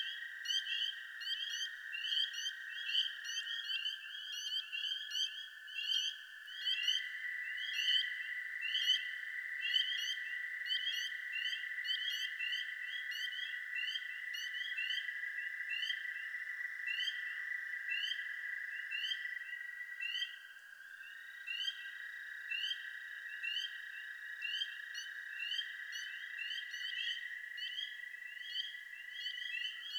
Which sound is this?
Sound effects > Animals
Spring Night Frogs (loop)
Spring peepers and American toads going crazy at night in the spring, recorded with Clippy EM272s and a Zoom H1n near a creek in the Ozarks. Loops seamlessly.
spring, nature, zoom, night, nighttime, loop, ambient, toad, frogs, american, em272, field-recording, peepers, chorus, h1n